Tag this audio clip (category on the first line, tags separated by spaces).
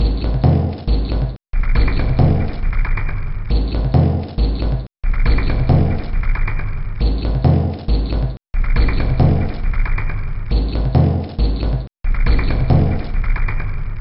Instrument samples > Percussion
Soundtrack Loop Samples Loopable Ambient Drum Industrial Alien Packs Weird Underground Dark